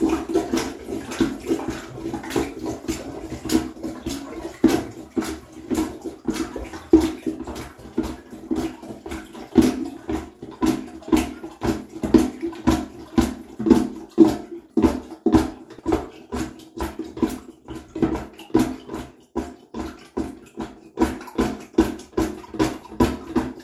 Sound effects > Objects / House appliances
WATRPlmb-Samsung Galaxy Smartphone, CU Plunger, Plungering on Toilet Nicholas Judy TDC
A plunger plungering on a toilet.
foley, Phone-recording, plunger, toilet, water